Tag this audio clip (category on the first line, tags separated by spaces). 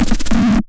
Instrument samples > Synths / Electronic
synth bassdrop stabs subbass drops wobble clear wavetable low lowend lfo sub synthbass subwoofer bass subs